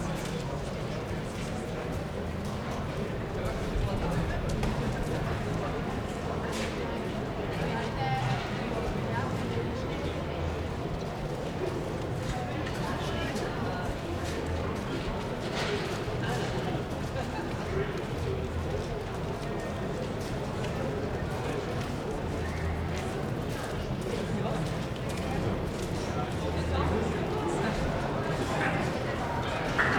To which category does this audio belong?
Soundscapes > Urban